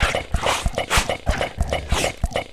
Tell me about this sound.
Music > Other
Angry Dog Sound Loop 190Bpm
I record an angry dog with my tascam DR 40 and then I processed it in to ableton.
dog, beat, animal, rhythm, weird, angry, percussion, drum, loop, percussion-loop, rhythmic, 190bpm, quantized, drums